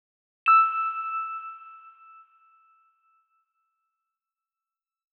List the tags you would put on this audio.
Sound effects > Electronic / Design
Chime
Animation
Sound
Ting
Quality
Ding
Ring
Cinematic
SFX
Interface
High
Bell

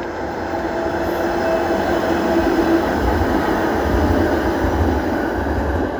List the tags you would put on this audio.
Soundscapes > Urban
Drive-by
field-recording

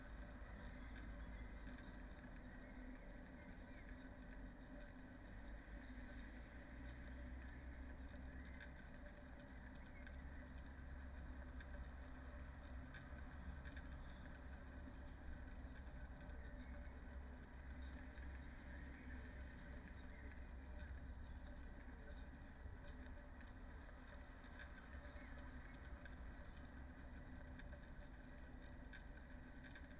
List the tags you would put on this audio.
Soundscapes > Nature
artistic-intervention
modified-soundscape
raspberry-pi
sound-installation
weather-data

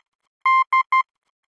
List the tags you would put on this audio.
Sound effects > Electronic / Design
Morse
Telegragh
Language

